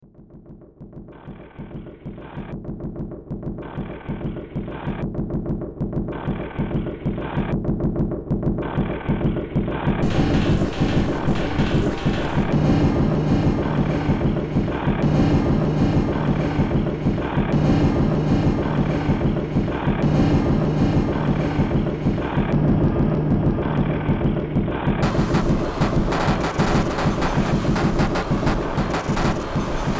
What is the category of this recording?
Music > Multiple instruments